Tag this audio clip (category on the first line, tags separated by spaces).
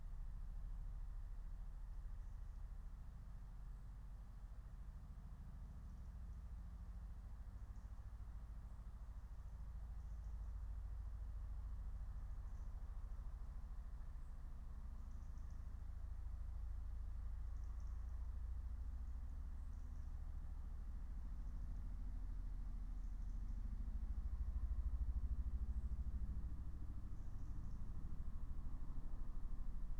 Soundscapes > Nature
alice-holt-forest phenological-recording meadow natural-soundscape soundscape